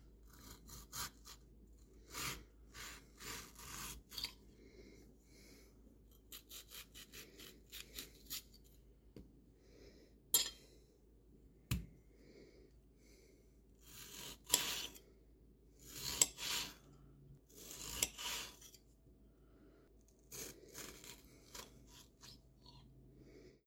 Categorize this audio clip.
Sound effects > Objects / House appliances